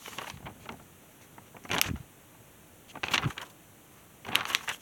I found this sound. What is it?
Sound effects > Objects / House appliances
Flipping pages of my notebook aggressively to create a "researched" or "scroll obtained" sort of sound effect. Sorry for any wind in the audio from the paper flipping!